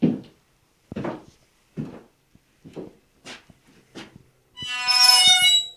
Soundscapes > Other
Footsteps and creaky hinge on Keno Hill
A few footsteps on a wood floor in an echo-y old shack, followed the opening of a door with a very creaky hinge. The structure is most likely the last remaining building from 1920s-era Sheep Camp minesite. Recorded with a Sony MiniDisc on Keno Hill, Yukon in 2008.
keno-city, yukon, sheep-camp, creaky-hinge, keno, footsteps, signpost, old-building, door-hinge, field-recording, keno-hill, wood-floor